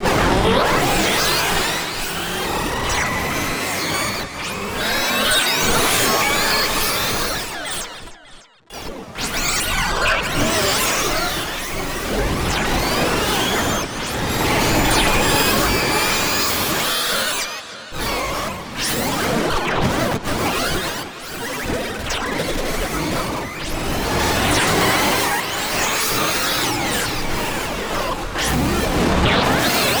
Sound effects > Electronic / Design
Optical Theremin 6 Osc Shaper Infiltrated-037
Experimental, Impulse, Otherworldly, Theremin, Robotic, DIY, FX, Weird, Electronic, SFX, EDM, Robot, Gliltch, Crazy, Saw, Alien, IDM, Analog, strange, Machine, Oscillator, Tone, Electro, Noise, Chaotic, Synth, Mechanical, Pulse, Loopable